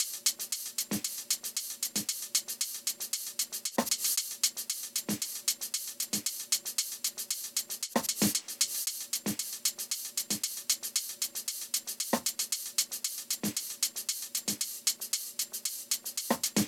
Instrument samples > Percussion
aggressive hat and perc loop (115bpm)
115bpm, dance, drum, drums, groovy, hihats, loop, percs, percussion, sample